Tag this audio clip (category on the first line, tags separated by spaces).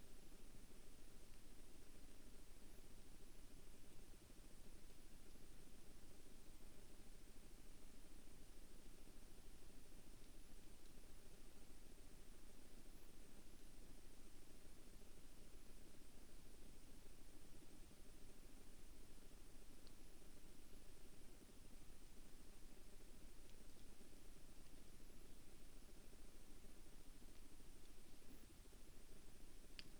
Soundscapes > Nature
weather-data nature phenological-recording natural-soundscape Dendrophone modified-soundscape alice-holt-forest sound-installation raspberry-pi field-recording artistic-intervention soundscape data-to-sound